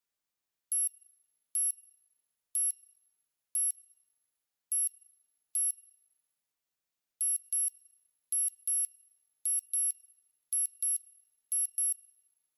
Sound effects > Electronic / Design
Beep Beep
That sound effect was created with a synthesizer, mimicking the "beep" tone from electronic devices. You're free to cut it up and mix the pieces however you want — enjoy! 这个音效模仿电子设备的“嘀”声用合成器制作而成，你可以随意剪切混合成想要的声音尽情享受吧！
electronic, signal